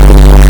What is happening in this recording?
Sound effects > Electronic / Design
A noisy square wave. Made by clipping by 17db a sample recorded on my DJI Mic 3.
experimental, Oscillation, sample